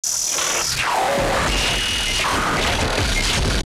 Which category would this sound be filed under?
Sound effects > Electronic / Design